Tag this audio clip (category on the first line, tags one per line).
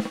Music > Solo percussion
crack
snaredrum
hits
snares
snare
oneshot
realdrums
ludwig
brass
rimshot
roll
drumkit
rim
fx
beat
sfx
realdrum
perc
reverb
snareroll
percussion
drums
drum
acoustic
processed
hit
kit
rimshots
flam